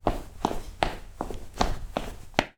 Sound effects > Human sounds and actions
Jogging in place.